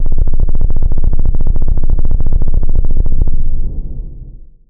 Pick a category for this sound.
Instrument samples > Synths / Electronic